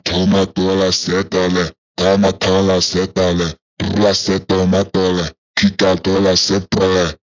Music > Other
Random Brazil Funk Acapella 2
Recorded with my Headphone's Microphone. I was speaking randomly, I even don't know that what did I say, I just did some pitching and slicing works with my voice. Processed with ZL EQ, ERA 6 De-Esser Pro, Waveshaper, Fruity Limiter. The first version of this sound in this web was deleted, because it was sounded shitty.
Acapella, Brazil, BrazilFunk, Vocal